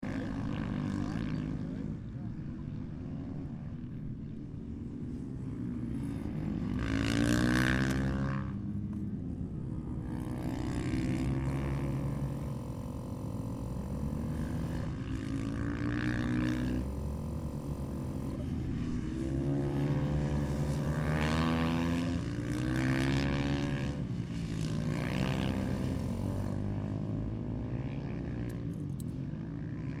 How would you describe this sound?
Soundscapes > Other
Supermoto Polish Championship - May 2025 - vol.5 - Racing Circuit "Slomczyn"
Recorded on TASCAM - DR-05X; Field recording on the Slomczyn racetrack near Warsaw, PL; Supermoto Championship;
bikes, engine, motocross, motorbikes, race, tor